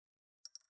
Sound effects > Objects / House appliances
quartz crystal tapping ceramic plate-004
quartz crystal foley scrape drag sfx fx perc percussion tink ceramic glass natural
foley, glass, drag, scrape, tink